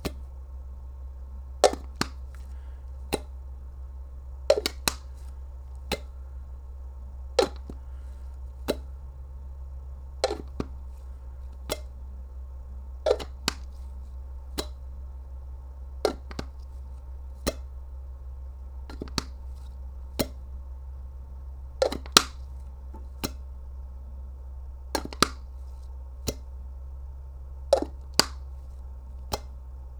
Sound effects > Objects / House appliances
A plastic cookie jar opening and closing.